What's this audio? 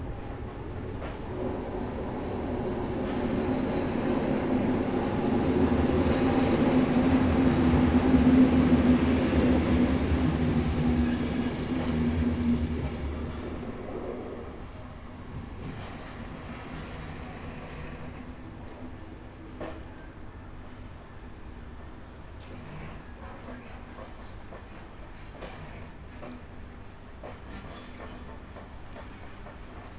Soundscapes > Urban
Recorded 14:43 14/04/25 Standing in a small street to the side of the railway, often used as a shortcut by pedestrians. Nearby is the central train station. In front are 3 excavators moving and scraping rocks, part of a rebuilding of the approach leading into town. There’s also a noisy air conditioning of a commercial building. Zoom H5 recorder, track length cut otherwise unedited.